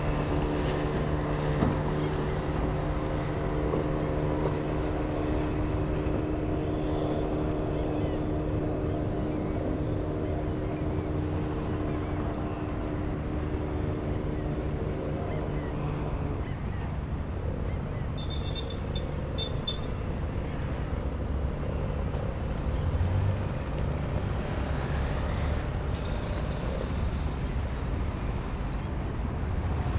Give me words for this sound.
Soundscapes > Urban
AMBNaut-ZH6 Ambience, Nautical, River harbor, vehicles pass by, boats, motorcycles, cars, birds sing, people pass by, portuguese, walla FILI URPRU
Ambiência. Porto, tarde, gaivotas, barcos, motos e carros passando, perto do rio, sirenes, ruído da cidade, urbano, pessoas passando, português. Gravado no Centro de Manaus, Amazonas, Brasil. Gravação parte da Sonoteca Uirapuru. Em stereo, gravado com Zoom H6. // Sonoteca Uirapuru Ao utilizar o arquivo, fazer referência à Sonoteca Uirapuru Autora: Beatriz Filizola Ano: 2025 Apoio: UFF, CNPq. -- Ambience. Harbor, afternoon, seagulls, boats, motorcycles and cars pass by, near river, city hum, urban, people pass by, portuguese. Recorded at Downtown Manaus, Amazonas, Brazil. This recording is part of Sonoteca Uirapuru. Stereo, recorded with the Zoom H6. // Sonoteca Uirapuru When using this file, make sure to reference Sonoteca Uirapuru Author: Beatriz Filizola Year: 2025 This project is supported by UFF and CNPq.
ambience, barcos, birds, brazil, bus, car, city, field-recording, general-noise, harbor, honk, moto, motorcycle, pass-by, porto, rumble, sirens, soundscape, traffic, transito, vehicles, vozerio, walla